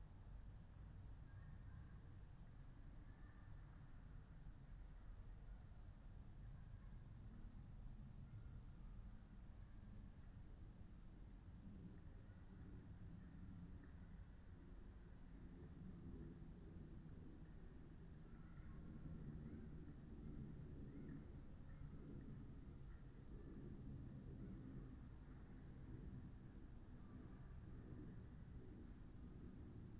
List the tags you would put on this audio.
Soundscapes > Nature
natural-soundscape
nature
raspberry-pi
data-to-sound
Dendrophone
phenological-recording
weather-data
artistic-intervention
alice-holt-forest
sound-installation
field-recording
modified-soundscape
soundscape

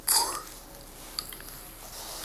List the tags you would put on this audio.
Sound effects > Objects / House appliances
bonk
clunk
drill
fieldrecording
foley
foundobject
fx
glass
hit
industrial
mechanical
metal
natural
object
oneshot
perc
percussion
sfx
stab

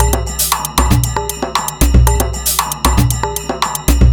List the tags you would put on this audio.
Music > Other
beat
drum
ethnique
percussion
rythm
world

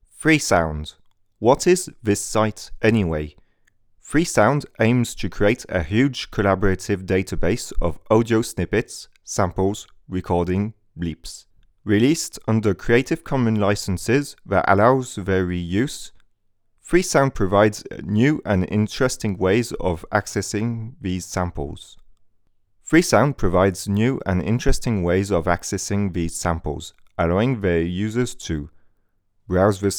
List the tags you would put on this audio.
Speech > Solo speech
freesound20
Rode
English
Reading
Sentence
NT5
20s
France
Anniversary
Tascam
male
FR-AV2
2025

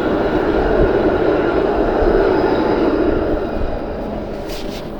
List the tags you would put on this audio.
Sound effects > Vehicles
tramway
transportation
vehicle

